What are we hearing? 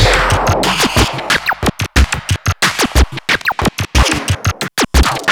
Sound effects > Electronic / Design
synth loop was made in my studio in Kyiv on the modular synthesiser with modules like Beads, Rample, Abacus etc.